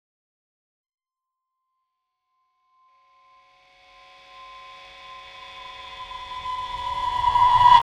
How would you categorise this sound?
Sound effects > Electronic / Design